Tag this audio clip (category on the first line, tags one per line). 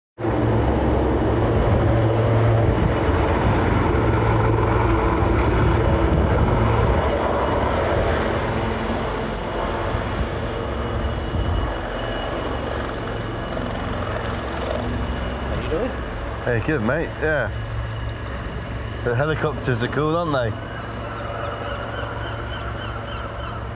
Sound effects > Vehicles
flying
helicopter
engine
propeller
chopper
overhead